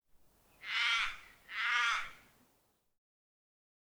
Sound effects > Animals
Crow call in suburban Goulburn. Recoreded in an enclosed grassed backyard (4 meter+ high conifer trees and hedge backed onto a multistory brick house. Recorded at midday on a blustery cold and overcast winters day.
bird, crow, crowing, sfx, squawk